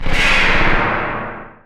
Instrument samples > Synths / Electronic
CVLT BASS 162
bass, bassdrop, clear, drops, lfo, low, lowend, stabs, sub, subbass, subs, subwoofer, synth, synthbass, wavetable, wobble